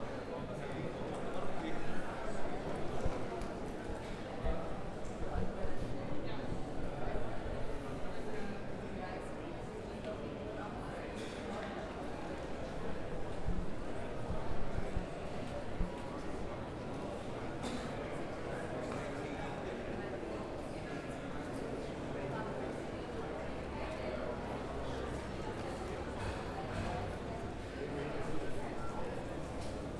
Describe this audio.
Soundscapes > Indoors
I recorded this sound while being at the Zurich Airport hall. I was in line at the gate and I was waiting for boarding. There are a lot of hidden sounds in the people chatting around me. Recorded with Tascam Portacapture X6